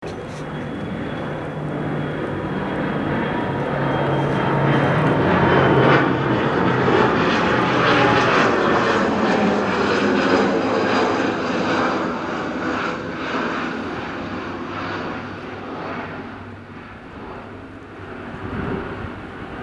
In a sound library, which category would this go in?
Sound effects > Other mechanisms, engines, machines